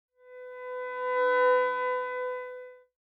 Sound effects > Electronic / Design
TONAL WHOOSH 3
air, company, effect, flyby, gaussian, jet, pass-by, sound, swoosh, transision, ui, whoosh